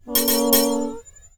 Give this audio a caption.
Sound effects > Other
combo lightning holy
38 - Combined Lightning and Holy Spells Sounds foleyed with a H6 Zoom Recorder, edited in ProTools together
lightning, combination, holy, spell